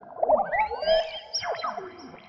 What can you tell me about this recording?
Soundscapes > Synthetic / Artificial
LFO Birdsong 62
massive, Birdsong, LFO